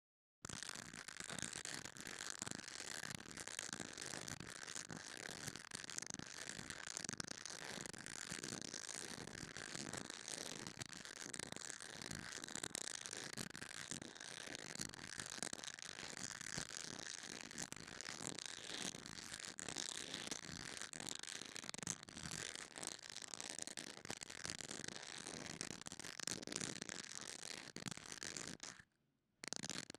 Sound effects > Electronic / Design
Creak SFX
A granularly processed sound derived from a plastic cat toy squeak (recorded on Tascam DR-05). Transformed into a dry, organic creak with subtle movement — perfect as a base layer for doors, furniture, or eerie environmental design. If you enjoy these sounds, you can support my work by grabbing the full “Granular Alchemy” pack on a pay-what-you-want basis (starting from just $1)! Your support helps me keep creating both free resources and premium sound libraries for game devs, animators, and fellow audio artists. 🔹 What’s included?
subtle, squeak, horror, creak, designed, dry, furniture, rustic, wood, texture, door, granular, foley, organic, ambient